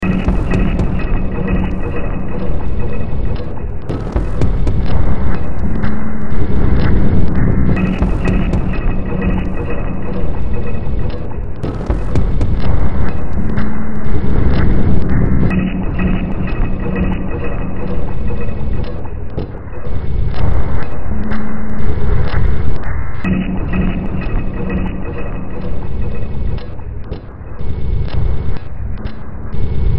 Music > Multiple instruments
Demo Track #3446 (Industraumatic)
Underground, Noise, Sci-fi, Ambient, Games, Horror, Industrial, Soundtrack, Cyberpunk